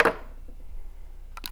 Sound effects > Other mechanisms, engines, machines
shop foley-044
rustle, sfx, percussion, little, oneshot, wood, sound, bop, boom, thud, perc, bang, pop, metal, tink, shop, foley, bam, knock, fx, strike, tools, crackle